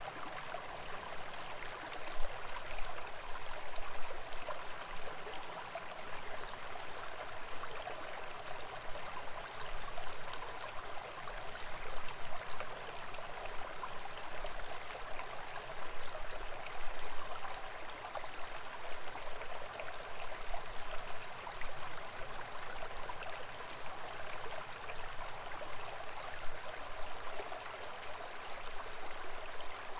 Soundscapes > Nature
Bachlauf Sound
Ein Bachlauf in Mecklemburgvorpommern Deutschland. Aufgenommen mit Tascam. A stream in Mecklenburg-Western Pomerania, Germany. Recorded with Tascam.